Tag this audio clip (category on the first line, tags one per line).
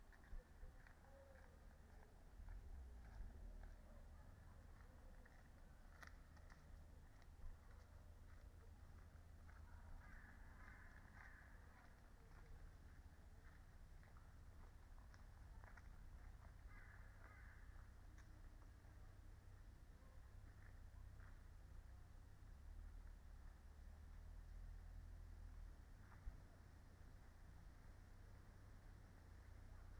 Soundscapes > Nature
phenological-recording
modified-soundscape
artistic-intervention
sound-installation
natural-soundscape
Dendrophone
nature
soundscape
alice-holt-forest
data-to-sound
field-recording
raspberry-pi
weather-data